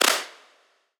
Instrument samples > Synths / Electronic
Clap one-shot made in Surge XT, using FM synthesis.